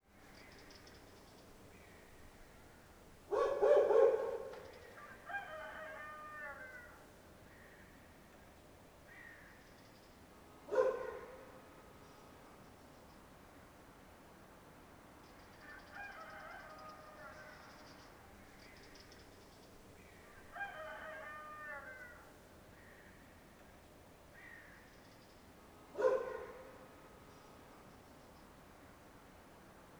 Soundscapes > Nature
Early morning countryside atmosphere.